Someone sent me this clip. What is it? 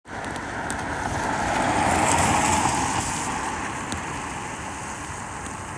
Sound effects > Vehicles
car rain 06
car, engine, rain, vehicle